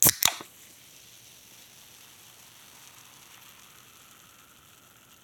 Sound effects > Objects / House appliances
Popping a soda can, with fizzing. Recorded with Dolby On app, from my mobile phone.